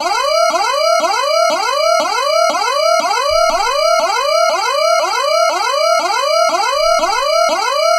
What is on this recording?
Sound effects > Electronic / Design
Looping Sci-Fi alarm SFX created using Phaseplant VST.
digital, danger, alert, warning, alarm, scifi